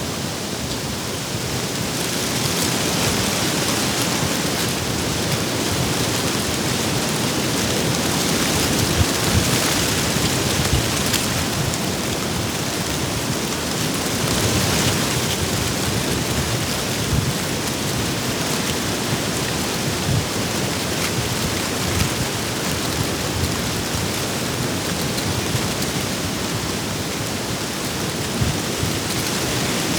Sound effects > Natural elements and explosions
250830 Gergueil Leafs in wind
Subject : Leafs blowing in the wind. Date YMD : 2025 August 30 around 12h10 Location : Gergueil 21410 Bourgogne-Franche-Comté Côte-d'Or France. Sennheiser MKE600 with stock windcover. P48, no filter. A manfroto monopod was used. Weather : A little windy more than the wind-cover could handle. But hopefully I was in between trees enough. Processing : Trimmed and normalised in Audacity.
wind, gergueil, rural, AV2, August, FR-AV2, leaf, MKE600, country-side, 2025, day, MKE-600, field-recording, Tascam, nature